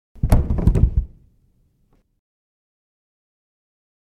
Sound effects > Human sounds and actions
Sound of a body dropping on a wooden floor with some ambience.
fall, ground, body